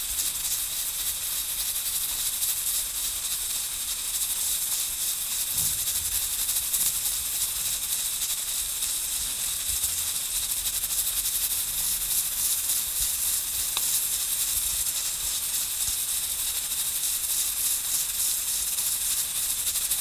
Sound effects > Other mechanisms, engines, machines
Pressure cooker (or pan) at work
Pressure cooker at work. Recorded with Zoom H2.
vapor, pressure